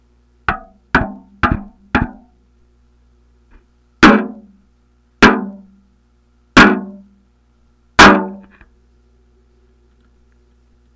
Sound effects > Objects / House appliances
metal can hits
A metal can being hit with my finger at different intensities, recorded with a cheap piezo contact mic, using a cheap amp.